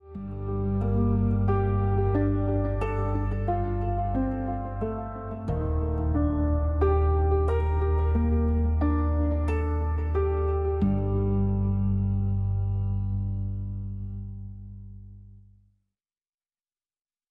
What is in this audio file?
Music > Other
depressive BM electric guitar sample
BM, depressive, electric, guitar, sample